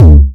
Instrument samples > Percussion
Retouched WhoDat Kick from flstudio original sample pack. Just tweaked the Boost amount from flstudio sampler. Then just did some pitching work and tweaked the pogo amount randomly. Processed with ZL EQ, OTT, Waveshaper.